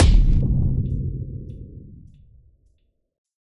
Sound effects > Electronic / Design
Impact Percs with Bass and fx-011
From a collection of impacts created using a myriad of vsts and samples from my studio, Recorded in FL Studio and processed in Reaper
bash, bass, brooding, cinamatic, combination, crunch, deep, explode, explosion, foreboding, fx, hit, impact, looming, low, mulit, ominous, oneshot, perc, percussion, sfx, smash, theatrical